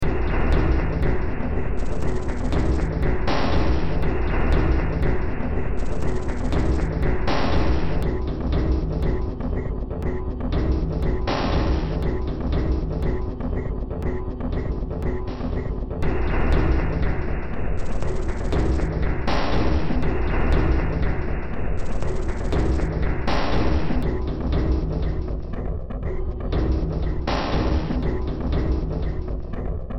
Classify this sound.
Music > Multiple instruments